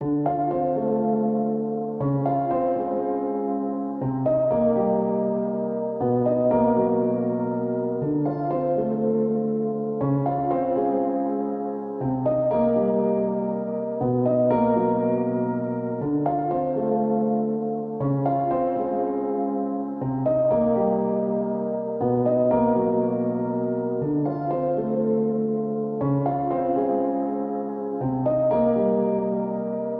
Music > Solo instrument
Piano loops 021 efect 4 octave long loop 120 bpm
simplesamples, loop, 120, 120bpm, free, piano, reverb, music, samples, simple, pianomusic